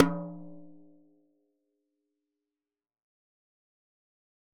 Music > Solo percussion
Hi Tom- Oneshots - 34- 10 inch by 8 inch Sonor Force 3007 Maple Rack
beatloop, flam, fill, perc, velocity, drumkit, beat, acoustic, oneshot, hi-tom, tom, percs, roll, percussion, hitom, toms, instrument, beats, kit, drums, tomdrum, drum, studio, rimshot, rim